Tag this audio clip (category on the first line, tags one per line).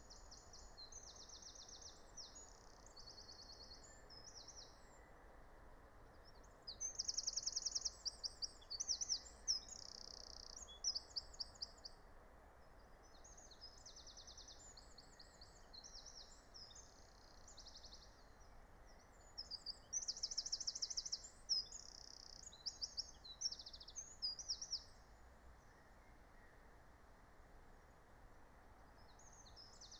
Soundscapes > Nature
field-recording
nature
alice-holt-forest
natural-soundscape
meadow
soundscape
phenological-recording
raspberry-pi